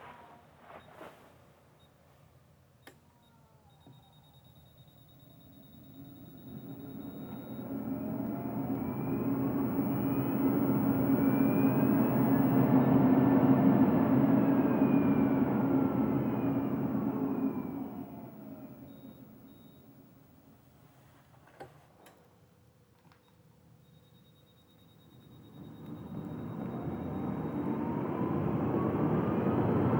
Sound effects > Objects / House appliances
Spinning up the sunny SF-T7945 under desk treadmill to full speed and letting it stop, done 3 times #0:05 : Remote used to set treadmill to full speed, stops afterwards due to no person detected, recorded from the front #0:25 : Same as 1, recorded from the back #0:40 : Treadmill set to full speed, ran on for a few seconds, and then dismounted, has the most time spent at full speed, recorded from the front Beeper was covered with glue to make quieter but can still be heard Recorded using motorola one 5G ace internal microphone Silence cut out using audacity